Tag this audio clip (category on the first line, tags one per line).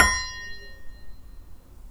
Sound effects > Other mechanisms, engines, machines
shop
metal
bam
perc
wood
knock
bop
tink
bang
rustle
sound
crackle
oneshot
percussion
strike
thud
little
boom
foley
sfx
fx
pop
tools